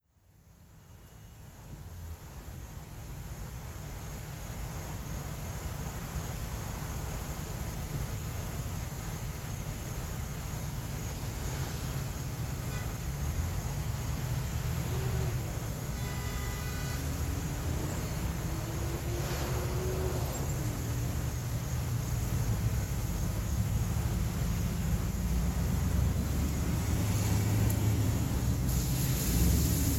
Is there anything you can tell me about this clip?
Soundscapes > Urban
AMBTraf-Samsung Galaxy Smartphone, CU Traffic, During Thunderstorm, Nighttime, Cars, Trucks, Brakes, Honks, Passes Nicholas Judy TDC
A traffic during a night thunderstorm. Cars, trucks moving with horns honking, brakes, passing and thunder rumbling, quiet insect noise and rain throughout.